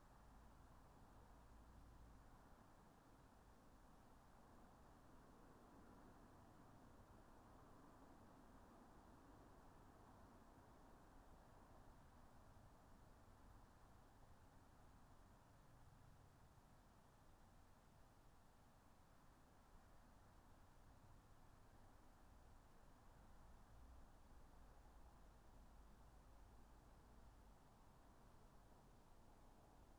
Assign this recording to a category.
Soundscapes > Nature